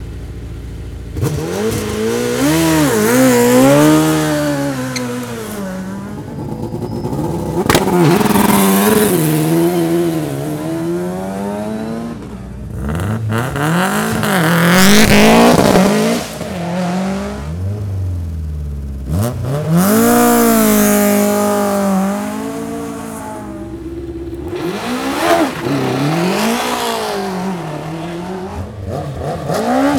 Sound effects > Vehicles
Rally Car Takeoff
2026 Noosa HillClimb Race, Various engines, V8, Rotary, Boxers. DPA lav mic, h5 Zoom,
takeoff, field-recording